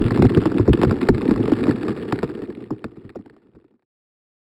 Sound effects > Electronic / Design
Deep Ice Cracking2
A Deep Ice Cracking inside of ice Glaciers designed with Pigments via studio One
Ice, Synth